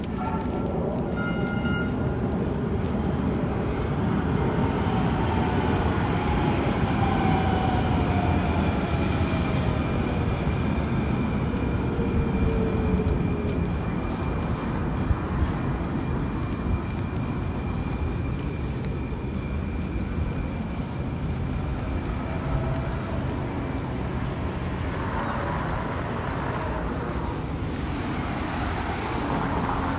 Soundscapes > Urban
outside-walking-metro
Outdoor walking ambience with metro, car, and people background noises.
ambiance, ambient, background, metro, walking